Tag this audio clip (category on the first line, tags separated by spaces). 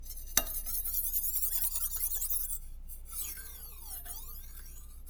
Sound effects > Objects / House appliances
ding Vibration Trippy Beam Clang metallic SFX Klang Perc Foley ting Vibrate FX Wobble Metal